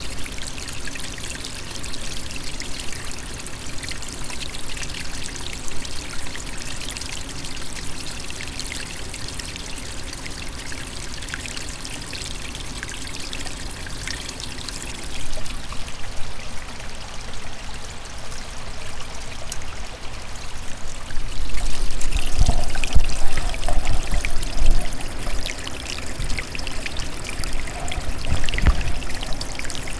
Soundscapes > Nature
snowmelt rapid
Rapid Snowmelt on Mt. Rainier, June of 2021. The recorder was placed in a little shielded gully area. Recorded with Sony pcm-d100.
snowmelt, mountain, snow, water, river, brook, melt, stream